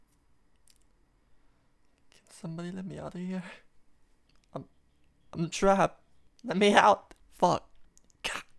Speech > Solo speech
let me out
Sample created for in a box on the album SDNNFY without any added effects